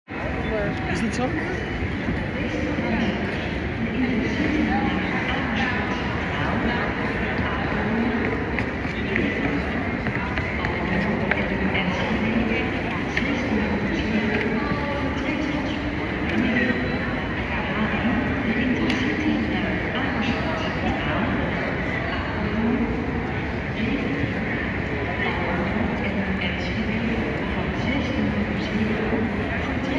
Soundscapes > Indoors
Intern walla Dutch Hoog Catharijne station hall announcer 2026-01 HZA
iPhone 6 stereo recording of walla of lots of people talking Dutch in a wide hall at Utrecht Central Station (Hoog Catharijne). Some suitcases.
ambience, announcer, chatter, Dutch, speech, station, talking, voices, walla